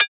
Instrument samples > Synths / Electronic
An abstract, metal-y one-shot made in Surge XT, using FM synthesis.
surge, electronic, synthetic, fm